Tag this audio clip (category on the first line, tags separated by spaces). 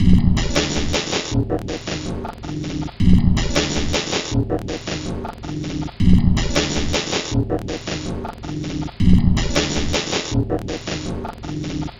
Instrument samples > Percussion
Drum Samples Packs Ambient Loopable Loop Underground Dark Industrial Alien Soundtrack Weird